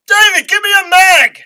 Speech > Solo speech
Soldier Yelling at for a Mag
Hope someone is named David for this sound lol
army, military, attack, agression, war